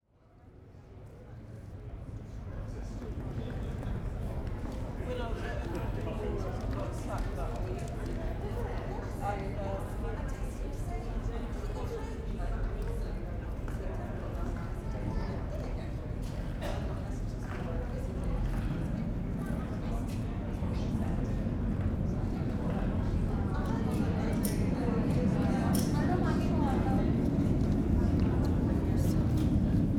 Soundscapes > Indoors
A recording at a London underground station.